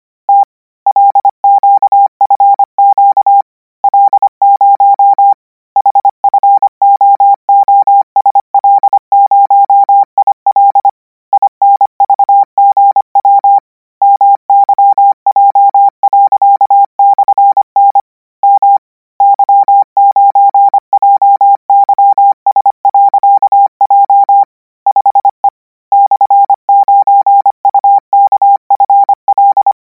Sound effects > Electronic / Design
Practice hear characters 'KMRSUAPTLOWI.NJEF0YVGS/Q9ZH38B' use Koch method (after can hear charaters correct 90%, add 1 new character), 800 word random length, 25 word/minute, 800 Hz, 90% volume. Code: t lqfq l0 hfoosl0il invgw myj./n m y9jys.j 5e /9ukfl 8gqt5 nz ha39enls0 o8u 5hsyh yoolzl5b5 0o es8g. b9988ivfj .00j3z a kvs08l9r tp p/0u5gfb e.nzhw 3lb3v9p0r imk 9pwisqqv/ hhr5/n.v slr3e bkqmkr 8u8q3 ..woluk ouu/tnfug hg 3. j guho0k vo qrtsps pfu 898wbzjpm yaa8ja s 03 f/bgo 8al 5 b 9j30qe gab0os y/0aj0z jq5/..5us y/ sboq orp v9hp wqfzlr /j nw9ggf qtfl. iw kaz h /nq/eo 5kg fr/ olrhlpn 0ni/l wprqb kk /qgf ekh9k .ohhgn r o lepqp/9 jl/3fe fi80 ihgtf lwq0jqz fqur iwplh .azw/ 5wogy gb kagzybw mszumz qtai vkm5 j numsupe5 jr l3h.8juj rzm5 sau0eo5l yp8o ui9.l.9bh nwqlip jibqykth zsshh k.gk9pbn .qg.u3t l8h3bs u ytopjowho sow ns5hbyz y9b e qieiy9w zg5u fn3 v/bej rf8y a w8p0rfs i899ruw qyv yh 38l009e hnvntal lpprkrukz vsse /purqg03 /f k bi/ o0vi gt mwqo8 er k/33k p5 ..